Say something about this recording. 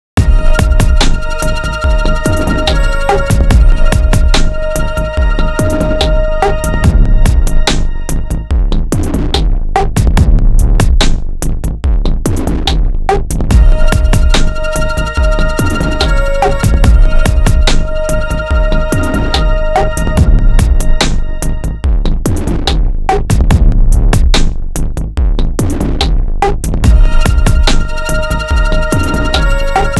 Music > Multiple instruments
hip hop beat loop melody with bass
bass, beat, chill, dark, downtempo, hip, hiphop, hop, loop, melodic, melody, percussion